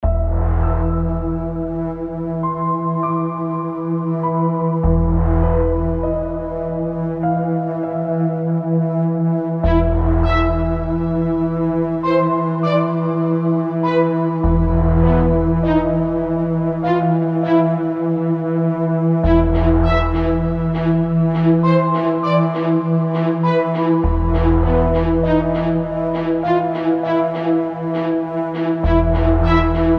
Music > Multiple instruments
Epic Dramatic Buildup Long
Buildup i created with multiple matching instruments.